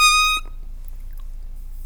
Sound effects > Objects / House appliances
mouth foley-004 squeek
beatbox, blow, bubble, bubbles, mouth, perc, sfx, squeek, whistle